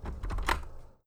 Sound effects > Objects / House appliances
COMTelph-Blue Snowball Microphone Nick Talk Blaster-Telephone, Receiver, Pick Up 02 Nicholas Judy TDC
Blue-brand, pick-up, telephone, Blue-Snowball, receiver, foley